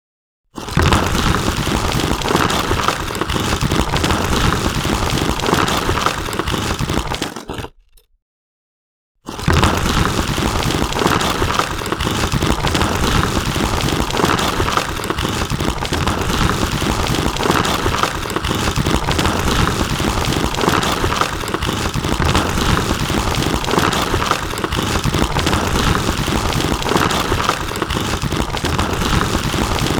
Sound effects > Natural elements and explosions
rock fall avalanche movement sound 01082026
sounds of rock, brick, stone or debris avalanche falling sounds. inspired by fate stay night heavens feel lost butterfly berserker vs saber alter and lion king 1/2 movie when timon destroyed the tunnels to make a sky light.